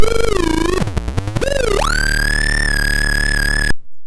Sound effects > Electronic / Design
Optical Theremin 6 Osc dry-098
Theremins, Sweep, Spacey, SFX, FX, DIY